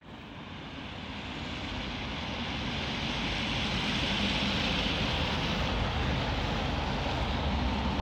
Soundscapes > Urban
Car driving by recorded in an urban area.
car, traffic, vehicle